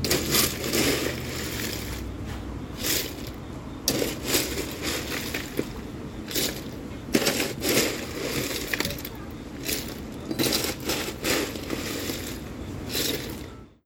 Sound effects > Objects / House appliances
OBJMisc-Samsung Galaxy Smartphone, CU Metal Scooper, Scooping Ice Nicholas Judy TDC
A metal scooper scooping some ice.